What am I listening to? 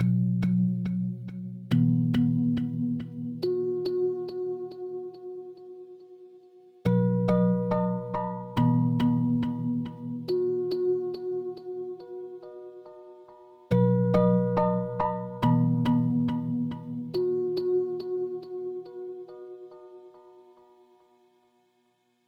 Music > Solo instrument
Incoming call ringtone in the style of early 2000s Frutiger aero. 140 bpm, made in FL Studio using only FL Keys, reverb, delay, EQ, and pitch control. Pitched down and warped version of the first one.